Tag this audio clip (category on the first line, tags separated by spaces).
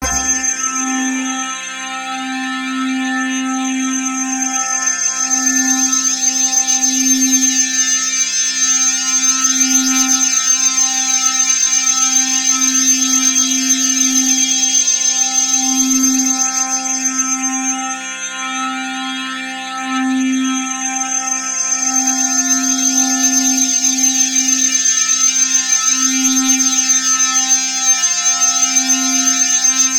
Instrument samples > Synths / Electronic
cinematic synth C4 space-pad pad one-shot ambient